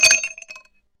Sound effects > Objects / House appliances
Ice cube falling in glass 1
Ice cube falling into a glass
glasses, rattle, dink, clink, clinking, glass, ice-cube, drop, ice